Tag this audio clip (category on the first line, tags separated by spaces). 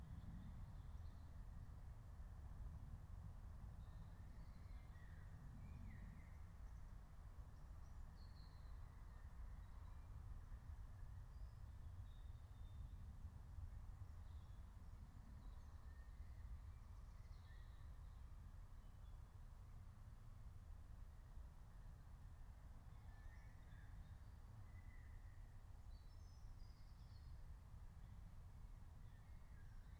Soundscapes > Nature
alice-holt-forest meadow nature raspberry-pi soundscape